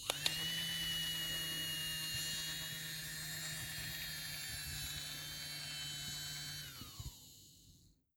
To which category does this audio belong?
Sound effects > Objects / House appliances